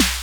Instrument samples > Synths / Electronic
TR-606-mod-sn OneShot 05
606, Analog, Bass, Drum, DrumMachine, Electronic, Kit, Mod, Modified, music, Snare, SnareDrum, Synth, Vintage